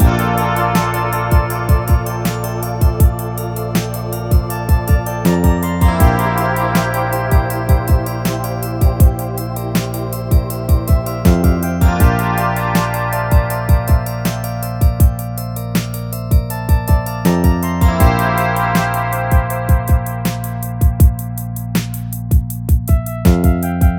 Music > Multiple instruments
Rap Instrumental (Always on my mind) 4
I felt it'd be interesting to add a beat to it to create a rap ballad instrumental. It has a tempo of 80 bpm and can be looped seamlessly on its own or with the other "Always On My Mind" samples.
sentimental-hip-hop-beat, 80-bpm-rap, hip-hop-sample, rap-ballad-hook, hip-hop, romantic-rap-instrumental, 80-bpm-hip-hop, sentimental-rap, love-ballad-rap, rap-instrumental, sentimental-hip-hop, sentimental-rap-beat, love-hip-hop-song, romantic-hip-hop, hip-hop-hook, rap-hook, rap-instrumental-music, 80-bpm, rap-ballad-beat, rap-ballad-instrumental, rap, rap-sample, hip-hop-instrumental, love-rap-song, hip-hop-ballad-beat